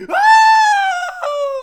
Sound effects > Human sounds and actions
old movie type scream
Old type scream